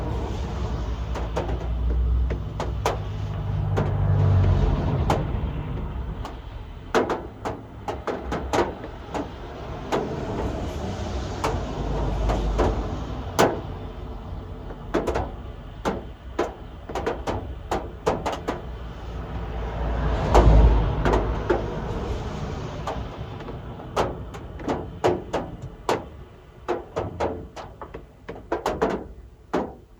Soundscapes > Urban
Rain from Inside of Car – Metallic Rooftop (part 2 of 2)
Sitting in a turned-off Citroen Berlingo minivan, I heard the sound of big raindrops clattering on the roof of the car. Part 1 and part 2 have a different microphone angle. A few moments in the recording are calm, with only the raindrops, in the other parts there is various traffic. It's an iPhone recording –I can hear some digital noises and artifacts, but on a soft volume (or with some editing) it should be useful, I think it's quite nice... Location: Eupen (capital of the German-speaking region of Belgium). Parked next to a quite busy road, under trees.
dripping, car-interior, rooftop, drops, car, water, metallic, steel, metal, car-roof, drips, field-recording, falling, belgium, rain, traffic, raindrops, raining, iphone-13-mini, cars-passing